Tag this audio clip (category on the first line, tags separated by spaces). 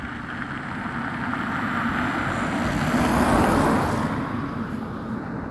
Sound effects > Vehicles
asphalt-road car moderate-speed passing-by studded-tires wet-road